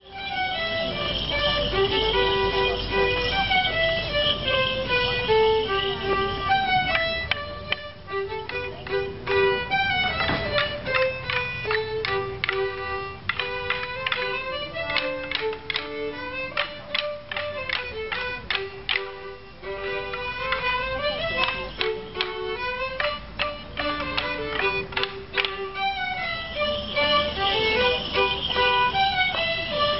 Soundscapes > Other
Morris Dancing
Excerpt during an old-fashioned Morris dance performance with wooden sticks and bells. Recorded as a mono16-bit audio with an Olympus LS-11 PCM recorder.